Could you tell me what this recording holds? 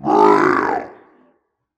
Speech > Solo speech
I don't even know. Recorded in December of 2024.